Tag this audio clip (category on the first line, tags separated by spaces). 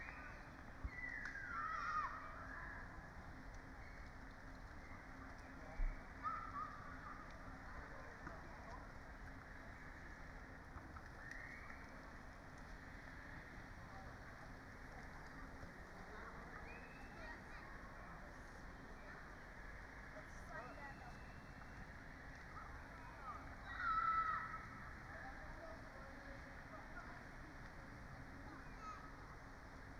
Soundscapes > Nature
modified-soundscape data-to-sound raspberry-pi natural-soundscape soundscape weather-data phenological-recording sound-installation Dendrophone nature field-recording artistic-intervention alice-holt-forest